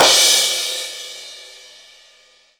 Instrument samples > Percussion
subcrash 1 abbreviated 1
Paiste, Zultan, crunch, metallic, shimmer, Sabian, metal, China, clash, polycrash, multi-China, Avedis, Istanbul, smash, crack, crash, Zildjian, Meinl, cymbal, multicrash, Stagg, clang, sinocymbal, sinocrash, bang, low-pitched, Soultone, spock